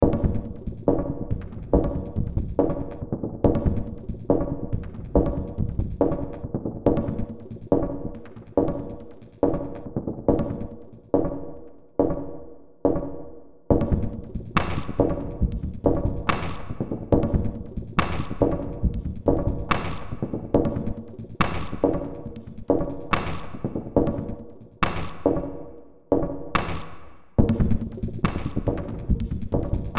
Music > Multiple instruments
Short Track #3074 (Industraumatic)
Horror, Soundtrack, Cyberpunk, Underground, Games, Ambient, Sci-fi